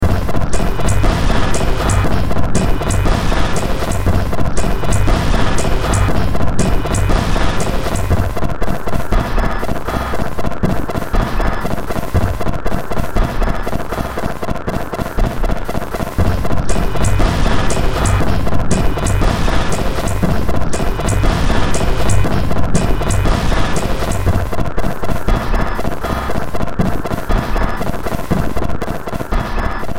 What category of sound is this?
Music > Multiple instruments